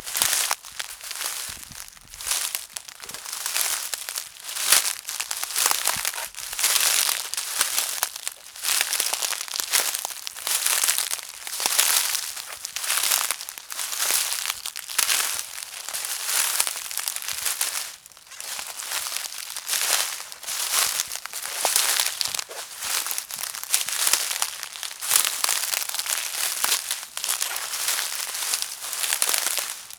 Soundscapes > Nature
Steps on dry leaves. Recorded with a Zoom H1essential